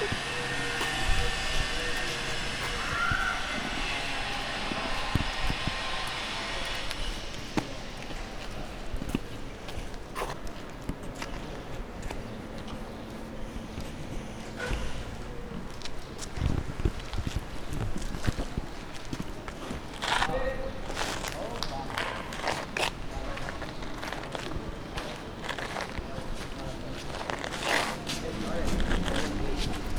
Sound effects > Human sounds and actions
20250326 JardiCanFabra Nature Birds Humans Steps
Urban Ambience Recording in collab with Martí i Pous High School, Barcelona, March 2025, in the context of a sound safari to obtain sound objects for a sound narrative workshop. Using a Zoom H-1 Recorder.
Nature, Humans, Birds, Steps